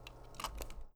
Sound effects > Objects / House appliances
COMCam-Blue Snowball Microphone Fuji Instax Mini 9 Camera, Film Compartment, Close Nicholas Judy TDC
A Fuji Instax Mini 9 camera film compartment closing.
Blue-brand, Blue-Snowball, camera, close, film-compartment, foley, fuji-instax-mini-9